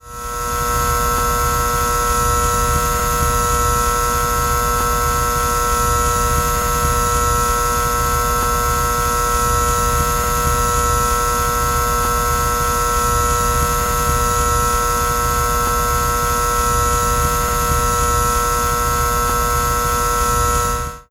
Sound effects > Electronic / Design
ELECBuzz-Samsung Galaxy Smartphone, CU Ballast, Hum Nicholas Judy TDC
A ballast humming. Recorded at the United States Post Office.
ballast, hum, Phone-recording